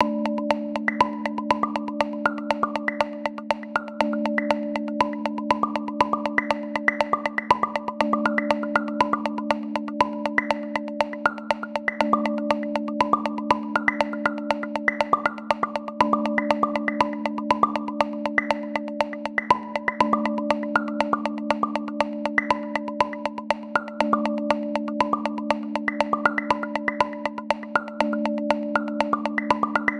Music > Multiple instruments
Drum Loop Blip Blop at 120bpm
loopable, drum-loop, loop, rhythm